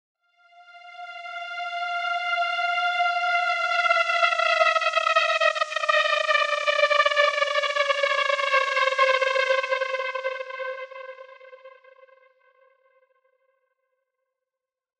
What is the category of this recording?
Instrument samples > Synths / Electronic